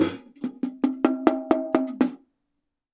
Music > Solo instrument
Vintage Custom 14 inch Hi Hat-020
Custom, Cymbal, Cymbals, Drum, Drums, Hat, Hats, HiHat, Kit, Metal, Oneshot, Perc, Percussion, Vintage